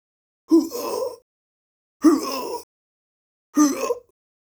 Speech > Solo speech
A sound effect of an Orc taking damage from player character. Could be useful for a game project like an RPG. Obviously you will need the Orc attacking noise i may make that or someone else on here may have a sound like roaring you can use already. Made by R&B Sound Bites if you ever feel like crediting me ever for any of my sounds you use. Good to use for Indie game making or movie making. This will help me know what you like and what to work on. Get Creative!
Hits, Orc, Damage, RPG, Wounded